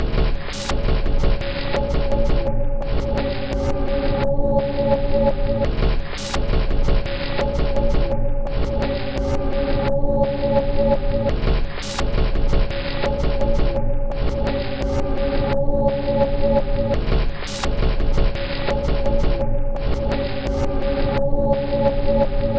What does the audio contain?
Instrument samples > Percussion
This 85bpm Drum Loop is good for composing Industrial/Electronic/Ambient songs or using as soundtrack to a sci-fi/suspense/horror indie game or short film.
Industrial, Loopable, Underground, Drum, Dark, Samples, Soundtrack, Ambient, Loop, Packs, Alien, Weird